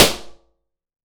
Soundscapes > Other
Subject : An Impulse and response (not just the response.) of my old livingroom in Esperaza Date YMD : 2025 July 11 Location : Espéraza 11260 Aude France. Recorded with a Soundman OKM1 Weather : Processing : Trimmed in Audacity.